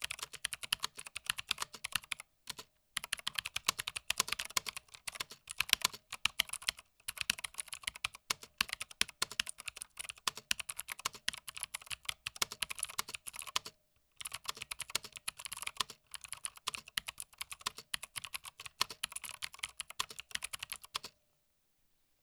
Sound effects > Other mechanisms, engines, machines
Fast Typing
Typing quickly on a Mechanical Keyboard. Recorded using a Pyle PDMIC-78
Clicking, key, Keyboard, Mechanical, Tapping, Typing